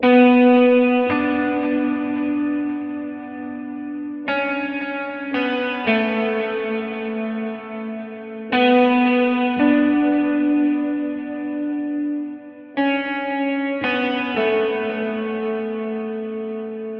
Instrument samples > Synths / Electronic
Electric Guitar Solo
electric guitar stem from my track I've Made a Mistake , 113 BPM made using kontakt
113bpm, electronic, guitar, lead, sample, stems